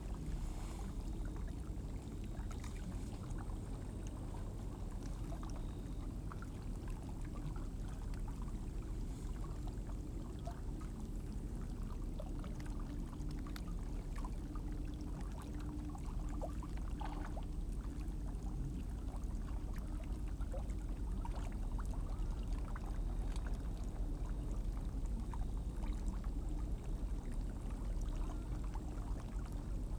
Soundscapes > Nature
AMBSwmp Lake Water Lapping at Rocks with Surrounding Fauna HushAndHarmony
Very beautiful park. Plenty of bird sounds with a constant calm lapping of water on the rocky shore. #09:24 - Weird Sound! #09:40 - Weird Rumble! #10:00 - Bird Call 2 (DST), again #14:05 - Bird Call 7 (DST) #14:19 - Bird Call 7 (DST), again #17:54 - Bird Call 8 (DST) #18:03 - Edward the Fly #22:12 - Bird Calls Mix
field-recording, waves